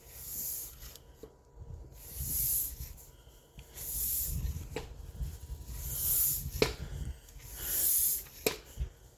Sound effects > Objects / House appliances
MACHPump-Samsung Galaxy Smartphone, MCU Bicycle, Single Pumps Nicholas Judy TDC
Individual bicycle pump pumps.
bicycle, bicycle-pump, foley, Phone-recording, pump